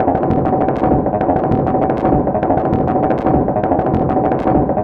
Instrument samples > Synths / Electronic
This 198bpm Synth Loop is good for composing Industrial/Electronic/Ambient songs or using as soundtrack to a sci-fi/suspense/horror indie game or short film.
Alien, Ambient, Dark, Drum, Industrial, Loop, Loopable, Packs, Samples, Soundtrack, Underground, Weird